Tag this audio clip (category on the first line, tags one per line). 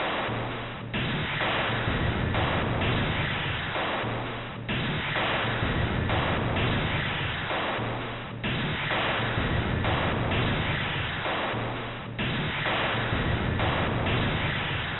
Instrument samples > Percussion
Alien
Ambient
Dark
Drum
Industrial
Loop
Packs
Samples
Soundtrack
Underground
Weird